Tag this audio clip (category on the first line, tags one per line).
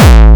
Instrument samples > Percussion
Distorted
gabber
Hardcore
Hardstyle
kick
Oldschool